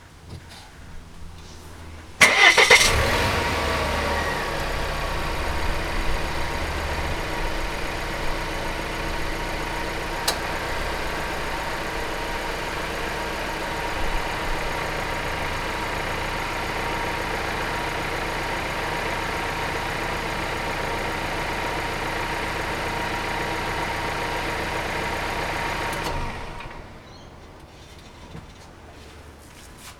Soundscapes > Other
Car Volvo XC40 Ext Start Run Stop. Zoom H1n
XC40, Car, Volvo, Stop, Run, Start, Ext